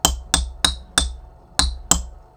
Music > Solo percussion
MUSCTnprc-Blue Snowball Microphone, CU Xylophone, Thai, Teakwood, Short, Comical Tune Nicholas Judy TDC
A short, comical teakwood thai xylophone tune.